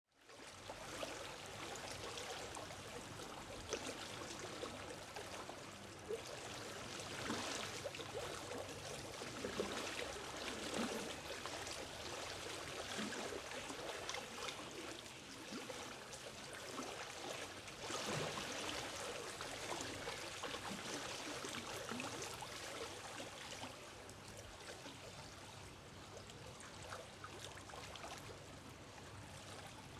Soundscapes > Nature
Ambisonics Field Recording converted to B-Format. Information about Microphone and Recording Location in the title.